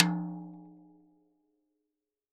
Music > Solo percussion
Hi Tom- Oneshots - 13- 10 inch by 8 inch Sonor Force 3007 Maple Rack
drums, fill, flam, instrument, kit, oneshot, percs, percussion, rim, rimshot, studio, tom